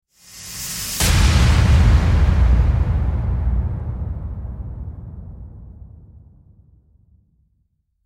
Sound effects > Other
Cinematic Rising Hit
movie, rising, cinematic, trailer, impact